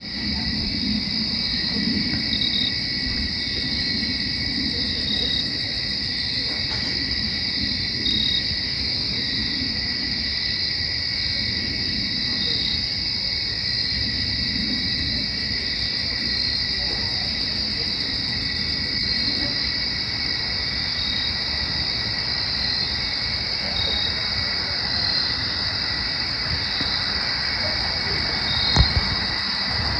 Soundscapes > Nature
Backyard cicadas
Cicadas, nighttime critters, and other evening noises from my backyard in Ann Arbor, MI. Recorded on my iPhone 15 Pro and edited in Logic Pro X.
field-recording, cicadas, summer, insects